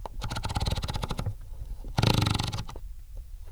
Sound effects > Vehicles
Ford 115 T350 - AC heat knob (rotating clicks)
SM57, Mono, 2003, 2025, Ford-Transit, Old, Tascam, Van, Ford, Single-mic-mono, 115, Vehicle, T350, August